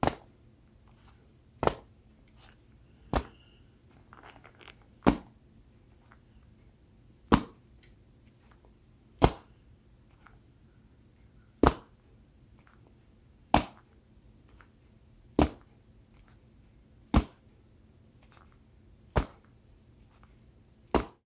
Sound effects > Objects / House appliances
SPRTField-Samsung Galaxy Smartphone, MCU Baseball, Catch with Mitt Nicholas Judy TDC
A baseball being catched with a mitt.
ball, baseball, catch, foley, mitt, Phone-recording